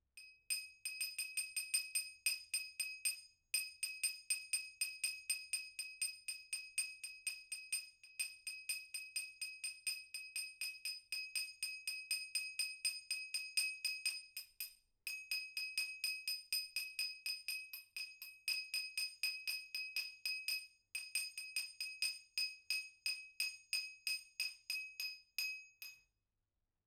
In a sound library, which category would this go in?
Sound effects > Other